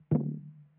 Sound effects > Experimental
Plastic Fermentation Container 1 EQ
Bonk sound recording
techno, deep, recorded, sample